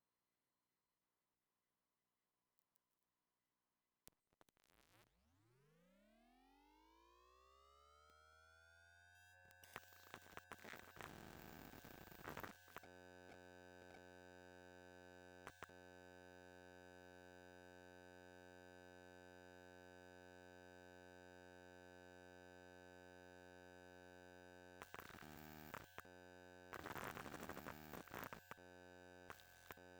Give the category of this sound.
Soundscapes > Other